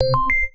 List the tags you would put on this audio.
Sound effects > Electronic / Design
alert
button
digital
interface
menu
notifications
options
UI

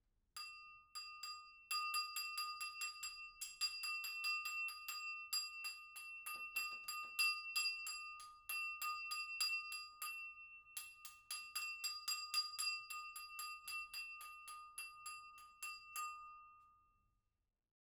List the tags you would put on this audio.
Sound effects > Other
wine-glass
applause
FR-AV2
solo-crowd
XY
stemware
Tascam
indoor
single
NT5
cling
person
individual
glass
clinging
Rode

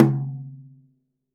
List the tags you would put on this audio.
Music > Solo instrument
Drums
Crash
Kit
Drum
Ride
Custom
Metal
FX
GONG
Percussion
Oneshot
Sabian
Perc
Hat
Paiste
Cymbals
Cymbal

